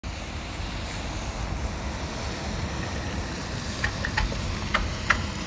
Soundscapes > Urban
A bus passing the recorder in a roundabout. The sound of the bus tires and the sound of the bus engine can be heard with rain sounds and some clicking sounds in the recording. Recorded on a Samsung Galaxy A54 5G. The recording was made during a windy and rainy afternoon in Tampere.